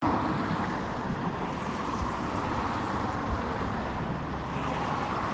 Sound effects > Vehicles
cars passing3
Multiple cars driving on a busy wet asphalt road, 10 to 20 meters away. Recorded in an urban setting in a near-zero temperature, using the default device microphone of a Samsung Galaxy S20+.